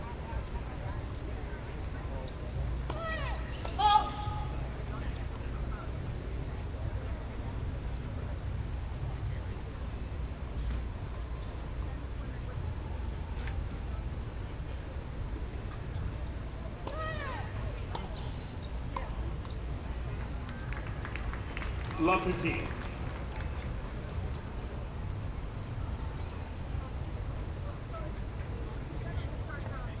Soundscapes > Urban
SPRTCourt-EXT Tennis Match, Hot Summer Day, applause, Cincinnati Open QCF Cincinnati Ohio iPhone SE2 with Sennheiser Ambeo
Outdoor Tennis Match, Hot Summer Day, Cincinnati Open, Cincinnati, Ohio. Announcer, Audience, Applause, Gameplay.
exterior, spectators, match, hot, game, tennis, audience, sports, court, sport, applause, summer